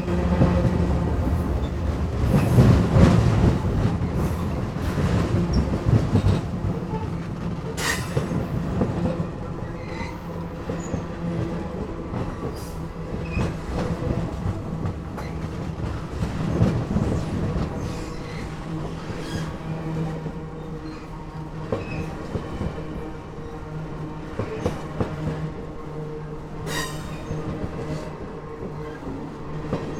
Sound effects > Vehicles
Train Passing Over Bridge
A train passes over a bridge. Captured from below.
bridge, railcar, railroad, railway, train, viaduct